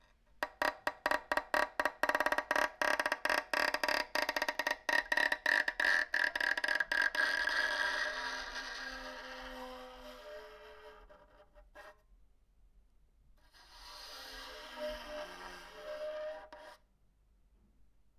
Instrument samples > String
Bowing broken violin string 5
beatup, bow, broken, creepy, horror, strings, uncomfortable, unsettling, violin